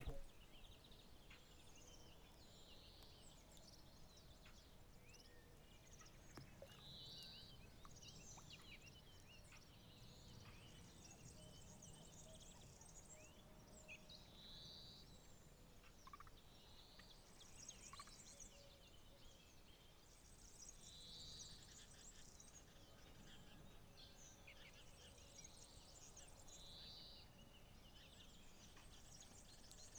Sound effects > Animals
PORTUGAL MORNING LAKE 3
Some recordings made around sunrise closer to a lake near a forest. Frogs and birds can be heard, Unprocessed recordings made with Zoom recorder
BIRDSONG, FROGS, LAKE, NATURE, WILDLIFE